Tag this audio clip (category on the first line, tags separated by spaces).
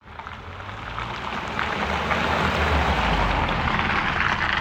Sound effects > Vehicles
car combustionengine driving